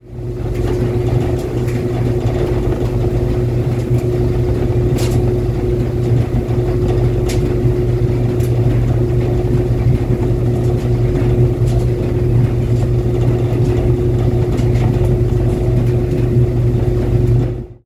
Sound effects > Objects / House appliances
MACHAppl-Samsung Galaxy Smartphone, CU Dryer, Running Nicholas Judy TDC
A dryer running.
Phone-recording, machine, dryer, run